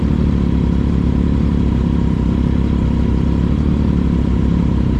Sound effects > Other mechanisms, engines, machines
Description (Motorcycle) "Motorcycle Idling: distinctive clicking of desmodromic valves, moving pistons, rhythmic thumping exhaust. High-detail engine textures recorded from close proximity. Captured with a GoPro Hero 4 on the track at Alastaro.The motorcycle recorded was a Ducati Supersport 2019."